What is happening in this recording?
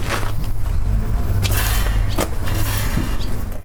Sound effects > Objects / House appliances
Junkyard Foley and FX Percs (Metal, Clanks, Scrapes, Bangs, Scrap, and Machines) 82
Ambience, Atmosphere, Bang, Bash, Clang, Clank, Dump, dumping, dumpster, Environment, Foley, FX, garbage, Junk, Junkyard, Machine, Metal, Metallic, Perc, Percussion, rattle, Robot, Robotic, rubbish, scrape, SFX, Smash, trash, tube, waste